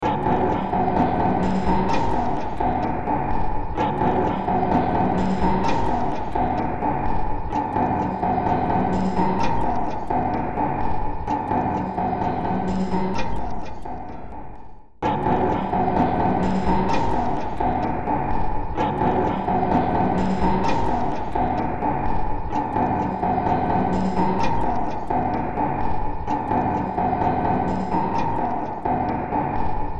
Music > Multiple instruments
Demo Track #3463 (Industraumatic)
Ambient, Cyberpunk, Games, Horror, Industrial, Noise, Sci-fi, Soundtrack, Underground